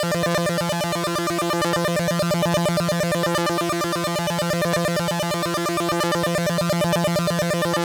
Sound effects > Electronic / Design
Clip sound loops 9
8-bit, game, clip, fx